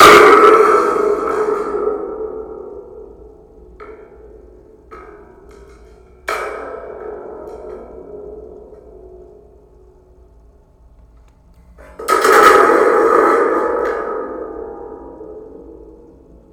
Music > Solo percussion
MUSCPerc-Blue Snowball Microphone, CU Thunder Tube, Crashes, Middle Is Quiet Nicholas Judy TDC
Thunder tube crashes, middle is quiet.